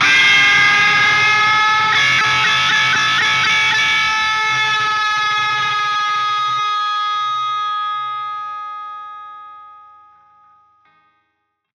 Instrument samples > String
Real Guitar Fender Strato
"High-gain electric guitar lead tone from Amplitube 5, featuring a British Lead S100 (Marshall JCM800-style) amp. Includes noise gate, overdrive, modulation, delay, and reverb for sustained, aggressive sound. Perfect for heavy rock and metal solos. Clean output from Amplitube."